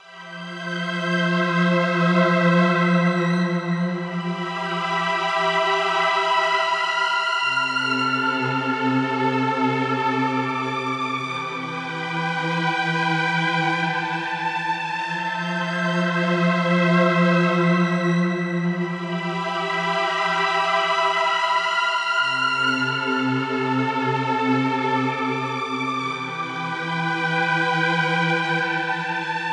Music > Solo instrument
Made in FL Studio with Analog Labs V. Use for anything :)